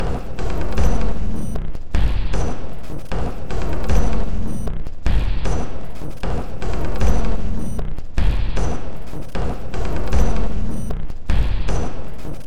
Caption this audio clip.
Instrument samples > Percussion
This 154bpm Drum Loop is good for composing Industrial/Electronic/Ambient songs or using as soundtrack to a sci-fi/suspense/horror indie game or short film.
Drum, Alien, Soundtrack, Loopable, Packs, Weird, Underground, Dark, Industrial, Loop, Samples, Ambient